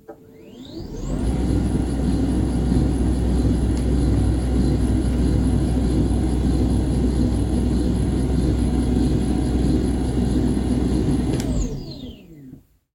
Sound effects > Other mechanisms, engines, machines
A desk servo motor lowering.